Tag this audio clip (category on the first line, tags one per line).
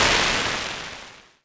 Sound effects > Electronic / Design
break
cartoony
crash
effect
game
retro
sfx
smash
sound-effect
ui
video-game